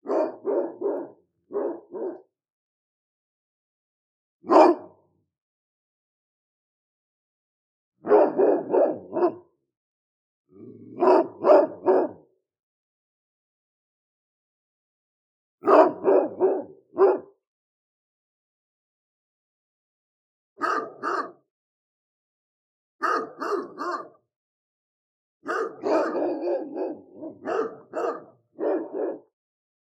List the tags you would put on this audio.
Sound effects > Animals
growling guard-dog angry barking bark dogs growl dog

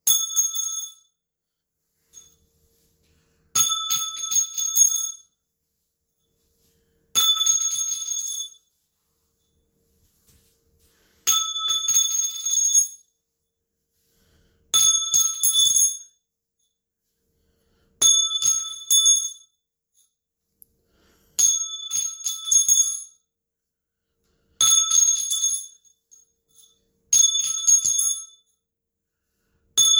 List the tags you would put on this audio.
Sound effects > Objects / House appliances
metal Phone-recording drop

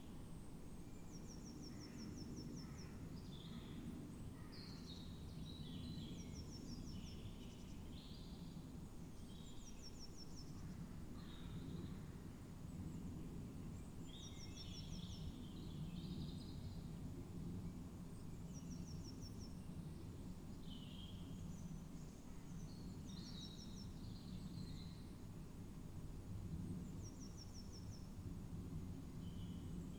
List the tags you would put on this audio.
Soundscapes > Nature
soundscape raspberry-pi phenological-recording nature natural-soundscape sound-installation field-recording data-to-sound alice-holt-forest artistic-intervention modified-soundscape Dendrophone weather-data

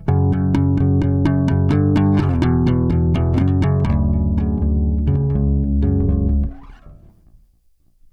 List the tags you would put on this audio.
Music > Solo instrument
notes basslines pick riffs blues slides chuny bass electric chords riff slide note low harmonic electricbass

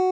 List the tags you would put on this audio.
Instrument samples > String
stratocaster arpeggio guitar cheap design tone sound

